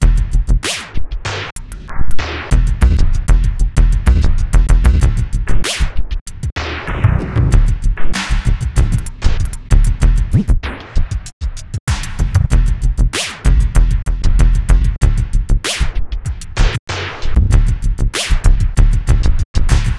Music > Multiple instruments
Industrial Beat (96 BPM, 8 bars) #2
Shifter filters are fun to use!
industrial-beat, quantized, distorted-loop, 96-bpm-loop, 96-bpm-8-bars, industrial-8-bar-loop, industrial-music-loop, ooh-is-it-haunted, 96-bpm, industrial-groove, 96-bpm-8-bar-loop, industrial, filtered-loop, industrial-loop, 8-bar-loop